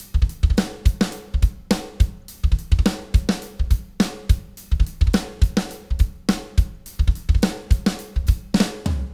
Music > Solo percussion
105 BpM - Groove Funky - 03
pop, beat, drums, funk, drumbeat, fast-bassdrum, fast-kick, indie, rock, drumloop, funky, acoustic-drums, syncopated, 105BpM, loop, natural-sound